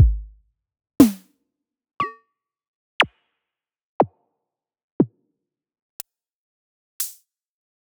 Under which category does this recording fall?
Instrument samples > Percussion